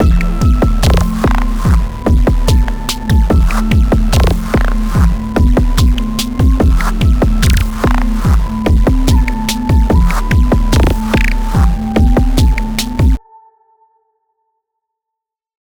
Music > Multiple instruments
new wave industrial glitchy edm idm beats loops patterns percussion melody melodies drumloop bass hip hop
beats, hip, hop, industrial, loops, melody, new, percussion, wave